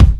Instrument samples > Percussion
kick Tama Silverstar Mirage 22x16 inch 2010s acryl bassdrum - kickfat 3
artificial, attack, bass, bass-drum, bassdrum, beat, death-metal, drum, drums, fat-drum, fatdrum, fat-kick, fatkick, forcekick, groovy, headsound, headwave, hit, kick, mainkick, metal, percussion, percussive, pop, rhythm, rock, semi-electronic, thrash, thrash-metal, trigger